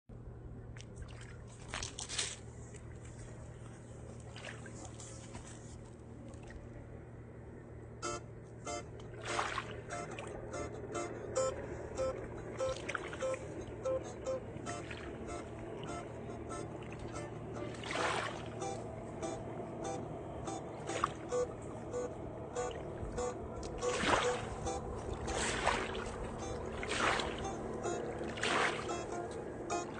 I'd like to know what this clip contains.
Soundscapes > Nature
Ambient guitar sound next to Danube River
I recorded this sequence playing guitar next to the river , just 1 meter away